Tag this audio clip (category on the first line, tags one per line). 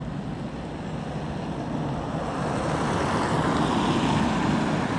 Soundscapes > Urban
car
city
driving
tyres